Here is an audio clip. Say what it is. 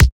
Instrument samples > Percussion
Synthwave-Kick 2
All samle used from FL studio original sample pack. I just pick up a FPC kick and Layered some Grv kick as its transient. Processed with ZL EQ, Waveshaper, FuzzPlus3, OTT, Khs Distortion.
Acoustic, BassDrum, Kick, synthwave